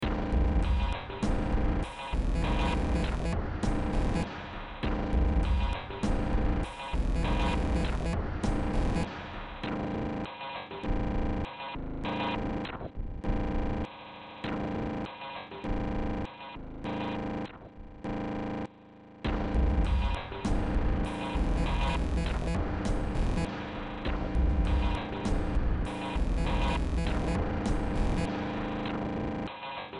Music > Multiple instruments
Demo Track #3073 (Industraumatic)
Soundtrack, Games, Sci-fi, Cyberpunk, Ambient, Underground, Noise, Horror, Industrial